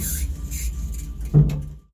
Sound effects > Other mechanisms, engines, machines
A hydraulic telephone booth close. Recorded inside.